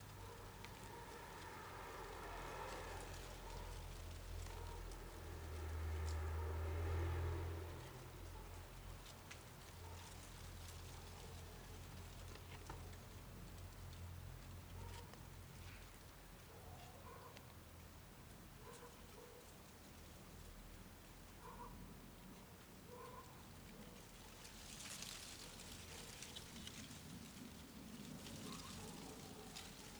Soundscapes > Nature
Winter 21Dec2025 DeadLeaves
The rustle of dead leaves (Japanese knotweed) recorded on the 21st december 2025 1pm, with a mild wind. A neighbour dog, some car and planes, winter birds. 2 x EM272 Micbooster microphones & Tascam FR-AV2
bourgogne, cold, dead-leaves, field-recording, rustle, weather, wind, winter